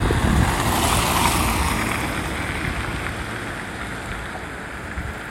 Sound effects > Vehicles
car sunny 13
car, engine, vehicle